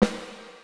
Music > Solo percussion
Snare Processed - Oneshot 105 - 14 by 6.5 inch Brass Ludwig
acoustic, beat, brass, crack, drum, drumkit, drums, flam, fx, hit, hits, kit, ludwig, oneshot, perc, percussion, processed, realdrum, realdrums, reverb, rim, rimshot, rimshots, roll, sfx, snare, snaredrum, snareroll, snares